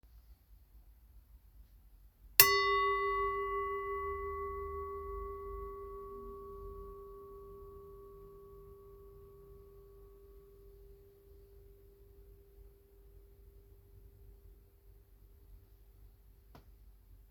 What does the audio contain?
Sound effects > Objects / House appliances
A metal lampshade rich in overtones being hit by a pair of scissors in a quiet soundscape. Recorded using a Samsung phone microphone in close vicinity to the object being hit. Microphone is positioned approximately 10cm underneath the lampshade